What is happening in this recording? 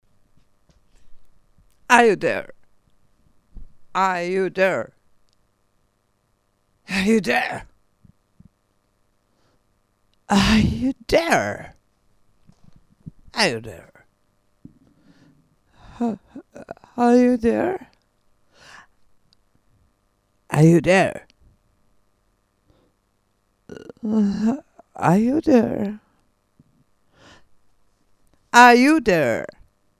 Soundscapes > Other
Whre you are- VOICE
For free. Thank you so much!
human, english, question, talk, heart, female, voice, vocal, woman, girl, speak